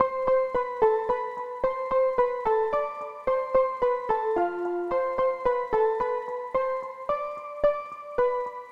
Instrument samples > Piano / Keyboard instruments
chill pluck melody 110BPM
chill synth and electric guitar plucking melody in 110 BPM. I made this melody in fl studio using ESW Vinyl Guitar 2 and layered it with Twin 3(euphoria preset).